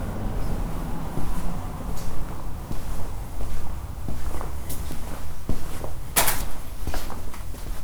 Sound effects > Other mechanisms, engines, machines
Woodshop Foley-097
shop, foley, boom, little, bop, tink, fx, crackle, rustle, knock, metal, perc, sound, tools, bam, bang, thud, percussion, strike, oneshot, wood, pop, sfx